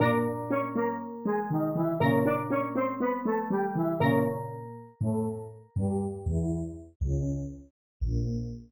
Instrument samples > Synths / Electronic
Random Synthetic Steel Pan Sequence
unserious, wacky